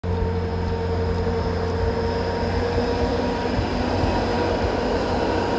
Soundscapes > Urban
A tram passing the recorder in a roundabout. The sound of the tram can be heard. Recorded on a Samsung Galaxy A54 5G. The recording was made during a windy and rainy afternoon in Tampere.